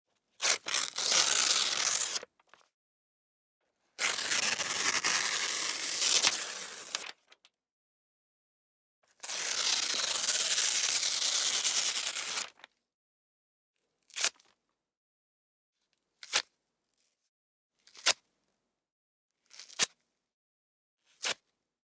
Sound effects > Objects / House appliances
Tearing up the paper 2
The sound of paper tearing. Recorded using Tascam Portacapture X8. Please write in the comments where you plan to use this sound. I think this sample deserves five stars in the rating ;-)